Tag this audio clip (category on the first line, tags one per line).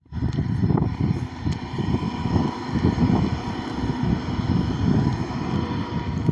Sound effects > Vehicles
drive
tram
vehicle